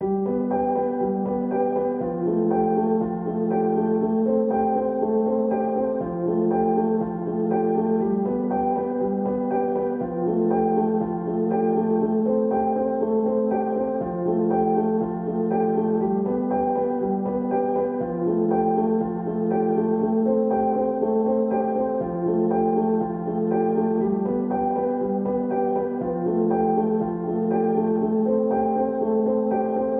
Music > Solo instrument
Piano loops 106 efect 4 octave long loop 120 bpm
120bpm, free, reverb